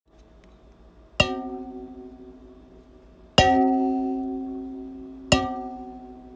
Sound effects > Natural elements and explosions
Bell ring/Wind Chime SFX (mimicked using hydro bottle)
Created this sound using a hydro water bottle and a belt buckle with rhythmic tapping. I was going for simulating a large tower bell ringing or possibly even a wind chime. Recorded with iphone 16 pro max and in quiet room.